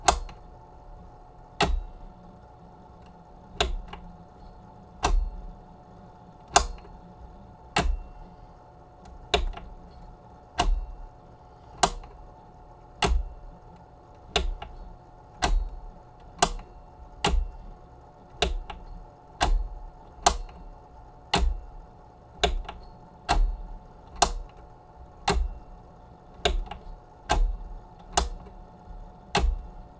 Sound effects > Other mechanisms, engines, machines
COMAv-Blue Snowball Microphone, CU Projector, Film, 8mm, Super 8, Switch, Toggle, Forward, Reverse Nicholas Judy TDC
Bell and Howell 8mm-Super 8 film projector forward-reverse switch toggling.
Blue-brand, Blue-Snowball, foley, forward, reverse